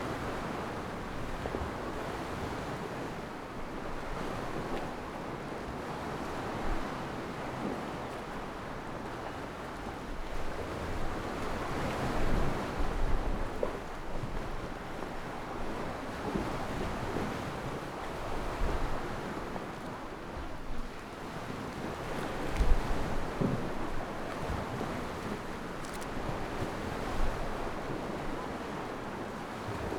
Soundscapes > Nature
Recorded with AT2020 and Rode AI-1 Bech sounds in the morning